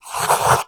Sound effects > Objects / House appliances
Fountainpen Draw 5 Swirl
Drawing a swirl on notebook paper with an ink fountain pen, recorded with an AKG C414 XLII microphone.
writing, fountain-pen